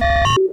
Sound effects > Electronic / Design
Digital Interface SFX created using Phaseplant and Portal.
alert, digital, interface, message, notification, selection